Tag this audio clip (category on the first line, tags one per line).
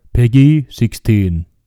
Speech > Solo speech
calm
human
male
man
videogame
voice